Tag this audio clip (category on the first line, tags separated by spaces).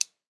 Sound effects > Human sounds and actions
toggle button activation switch off interface click